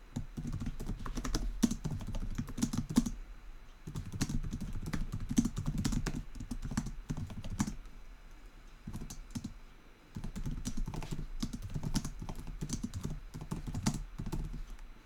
Soundscapes > Indoors
Typing on a laptop
I didn't mean to create a sound effect, but I did a recording of a video and noticed in editing that me editing a line in my script after failing to read it out several times sounded like a sound effect of an NPC typing in a game. The audio was recorded by a Razer Seiren V2 X Mono standing directly to the right next to my Dell Latitude 5530 on a solid wooden desk, and the sound has simply been extracted from the recording and not processed in any way. I hope my analog wall clock isn't too noticeable in the background, although my mic is quite directional and faces away from the wall that the clock hangs on, and the clock isn't that loud anyways. There are a few short bits of silence in here too, so if you have a good tool to analyze and remove background noise without destroying the sound quality, there you go.